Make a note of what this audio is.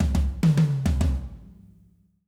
Music > Solo percussion
105 BpM - Tom Fill 80's - 04
I just had some fun coming up with a few ideas on my drum kit. I used a Mapex Armory kit with tom sizes of 10", 12", 14", a kick with 20" and a snare with 14"x5,5".
pop, fill-in, drum-fill, loop, rock, toms, roomy, acoustic, drumloop, Drums, indie, retro, natural-sound, drum-loop, Fill, 105bpm, 80s